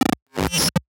Sound effects > Experimental
Singular glitch
Warning: may be loud or annoying to some people; This sound was made and processed in DAW; Another glitchy sound, this time a sinlge "pop"-effect. May be used in like glitch moments of some sort. Ы.